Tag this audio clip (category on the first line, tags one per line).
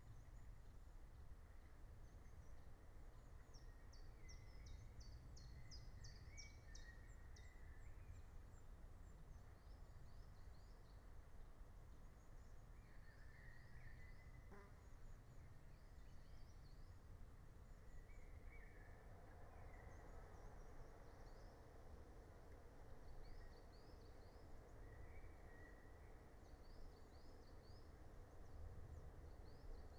Soundscapes > Nature
natural-soundscape
alice-holt-forest
soundscape
phenological-recording
raspberry-pi
meadow
nature
field-recording